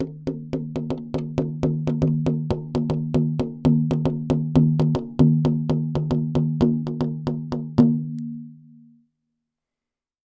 Music > Solo instrument
Delicate Tom Rhythm-001
FX, Drums, Sabian, Ride, Kit, GONG, Drum, Crash, Custom, Oneshot, Percussion, Paiste, Perc, Hat, Metal, Cymbals, Cymbal